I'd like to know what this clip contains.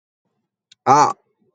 Sound effects > Other
ain-sisme
arabic, male, sound, vocal, voice